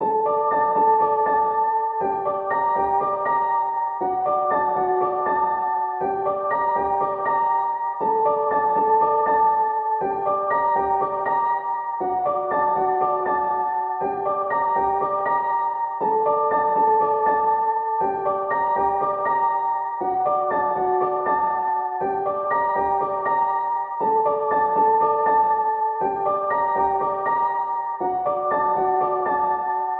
Music > Solo instrument
Piano loops 083 efect 4 octave long loop 120 bpm
120, 120bpm, free, loop, music, piano, pianomusic, reverb, samples, simple, simplesamples